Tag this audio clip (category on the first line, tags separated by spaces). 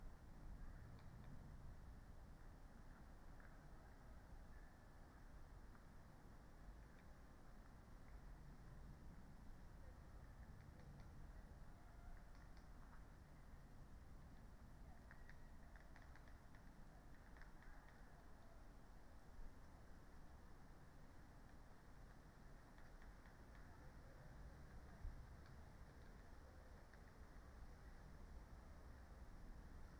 Soundscapes > Nature
alice-holt-forest artistic-intervention Dendrophone modified-soundscape nature phenological-recording soundscape weather-data